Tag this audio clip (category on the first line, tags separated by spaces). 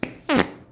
Sound effects > Other
fart flatulence gas